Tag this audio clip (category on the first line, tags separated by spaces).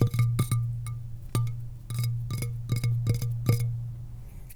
Music > Solo instrument
thud marimba tink loose keys perc